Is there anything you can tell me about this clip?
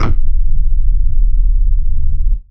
Instrument samples > Synths / Electronic
CVLT BASS 154
bass, bassdrop, clear, drops, lfo, low, lowend, stabs, sub, subbass, subs, subwoofer, synth, synthbass, wavetable, wobble